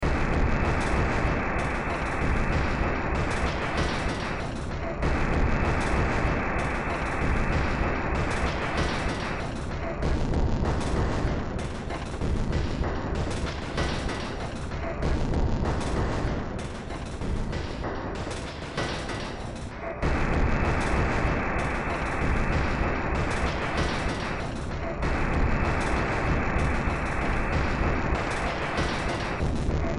Music > Multiple instruments
Demo Track #3832 (Industraumatic)
Soundtrack, Games, Industrial, Ambient, Noise, Underground